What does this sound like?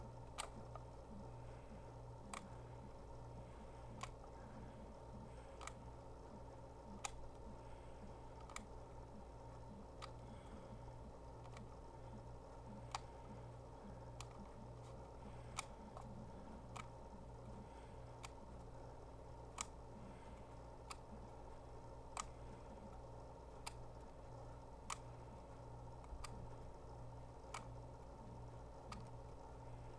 Sound effects > Objects / House appliances
A Kodak M4 Instamatic movie camera locking and unlocking.